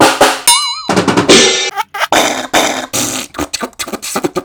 Sound effects > Electronic / Design
TOONMach-CU Crazy Inventor, Looped Nicholas Judy TDC
A crazy inventor or cartoon machine. Looped.
cartoon, crazy, inventor, machine